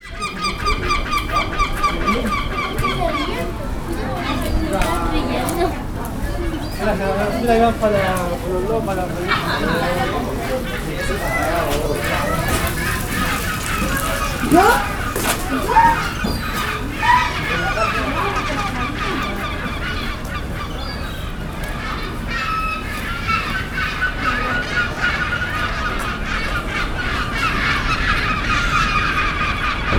Soundscapes > Urban
Caleta de Pescadores Valparaiso
Soundscape with seagulls in a fishermen's little port in Valparaiso, Chile.
america, Chile, field, fishermen, recording, seagulls, south, Valparaiso